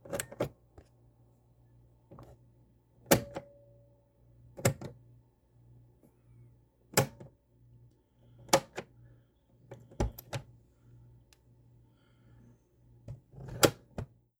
Sound effects > Objects / House appliances
COMAv-Samsung Galaxy Smartphone, CU Cassette Player, Open, Close Nicholas Judy TDC
A Crosley cassette player opening and closing.
cassette-player, close, foley, player